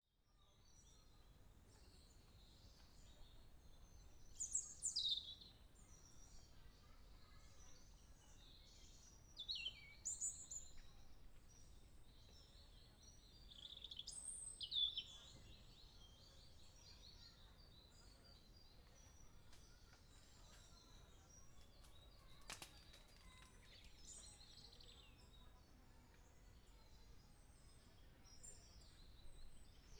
Soundscapes > Nature
Recorded that sound by myself with Recorder H1 Essential